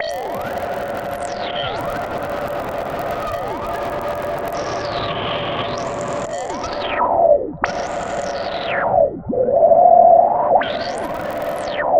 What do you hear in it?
Sound effects > Electronic / Design
Roil Down The Drain 6
mystery, sci-fi, scifi, sound-design